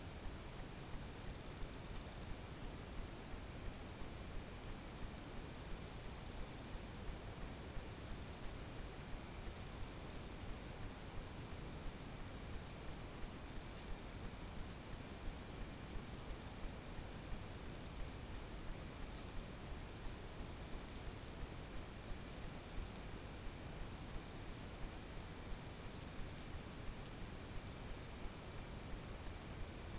Soundscapes > Nature
Subject : Ambience recording of Gergueil, at the start of "Brame" season (Stags shouting). My uncle saw some that boars digged a fair bit around fields and so I was trying to record that. Date YMD : 2025 September 07 19h53 Location : Gergueil 21410 Bourgogne-Franche-Comté Côte-d'Or France. GPS = 47.23784608300959, 4.822730587340072 Facing NW. Hardware : Zoom H2n with a sock as a windcover. Held up in a tree using a Smallrig magic arm. Weather : Processing : Removing 1 gain on side channels. Trimmed and normalised in Audacity. Notes : That night, I recorded with 4 microphones around the village.